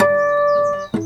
Music > Solo instrument
Acoustic Guitar Oneshot Slice 61
chord, fx, notes